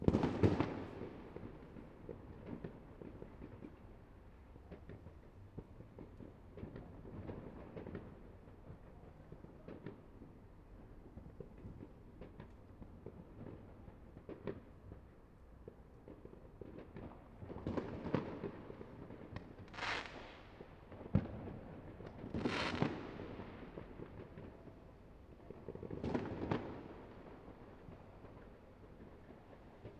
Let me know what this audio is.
Soundscapes > Urban
Fireworks, 5th Novemeber
ORTF recording off bonfire night in full swing in Glasgow's east end. Line Audio CM4's.
bonfire-night, city, cityscape, field-recording, fireworks